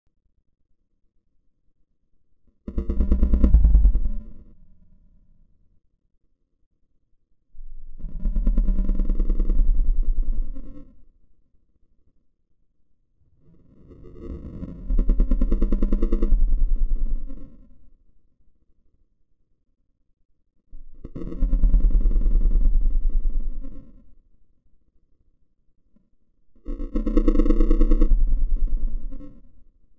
Sound effects > Animals
letters slowed 2a
This just sounds like a dinosaur or something else growling over and over. This could be a sound used in a horror video game to be the sound of some gross, putrid beast, or this could be used for that same reason, but in an adventure game where this is a beast.
beast, dinosaur, growl, horror, monster, weird